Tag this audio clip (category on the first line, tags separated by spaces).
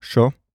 Speech > Solo speech
Adult FR-AV2 Generic-lines Hypercardioid july Male MKE600 Sennheiser Shotgun-mic Shotgun-microphone sure VA Voice-acting